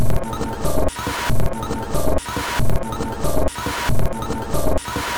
Instrument samples > Percussion
This 185bpm Drum Loop is good for composing Industrial/Electronic/Ambient songs or using as soundtrack to a sci-fi/suspense/horror indie game or short film.
Underground, Soundtrack, Drum, Weird, Packs, Loop, Alien, Dark, Loopable, Industrial, Ambient, Samples